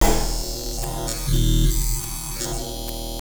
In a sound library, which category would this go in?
Sound effects > Electronic / Design